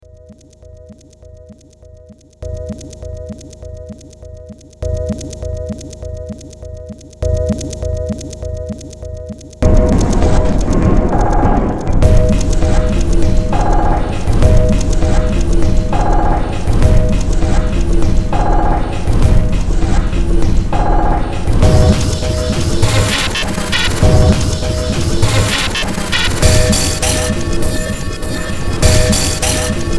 Music > Multiple instruments
Demo Track #3427 (Industraumatic)
Ambient, Cyberpunk, Games, Horror, Industrial, Noise, Sci-fi, Soundtrack, Underground